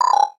Sound effects > Electronic / Design
Korg Knock
microkorg, ringtone, beep, blip, gui, sfx, korg, click, game, bleep, chirp, synth, computer, ui, electronic